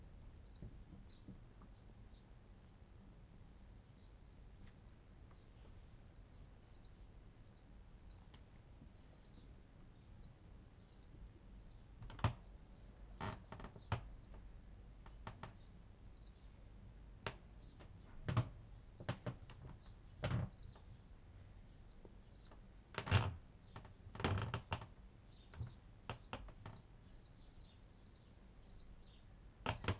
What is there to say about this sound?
Soundscapes > Urban
creaky floor in NZ motel